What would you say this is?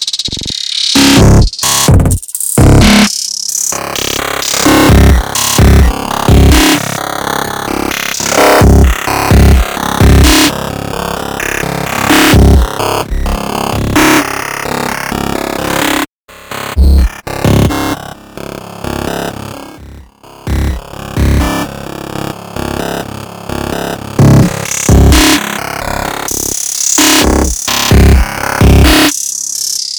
Music > Solo percussion
Glitch effect - Drum loop - 129bpm
Drum loop at 129 bpm, effected on a Pioneer FLX-10 with echo, crush, pitch and filter
129-bpm, crush, drum, drum-loop, drums, echo, effect, filter, FX, glitch, groovy, improvised, loop, percs, percussion, percussion-loop, pitch, quantized